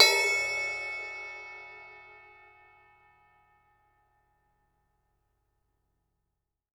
Music > Solo instrument
Sabian 15 inch Custom Crash-5
15inch, Crash, Custom, Cymbal, Cymbals, Drum, Drums, Kit, Metal, Oneshot, Perc, Percussion, Sabian